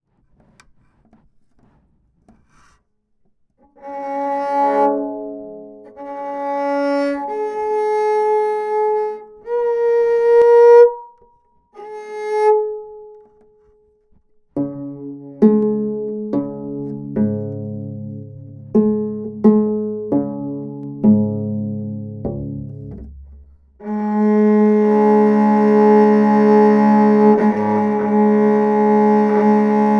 Instrument samples > String
MUSCStr-Contact Mic tunning strings SoAM Sound of Solid and Gaseous Pt 1
violoncello, contact, strings, tunning, tune, cello, string